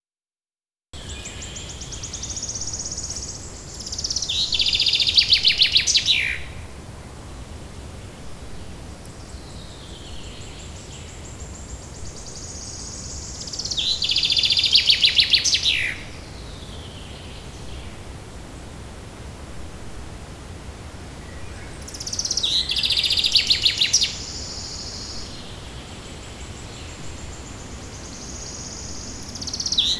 Soundscapes > Nature
Forest ambient with a birdsong (spatial)
ambient, birds, forest, nature